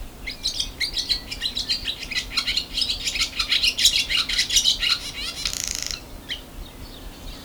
Sound effects > Animals
250427-15h57 Gergueil Bird
Subject : A bird in Gergueil Date YMD : 2025 04 27 15h57 Location : Gergueil France. Hardware : Zoom H5 stock XY capsule. Weather : Processing : Trimmed and Normalized in Audacity.
2025, Ambience, April, bird, Gergueil, H5, Outdoor, Rural, Spring, Village, XY, Zoom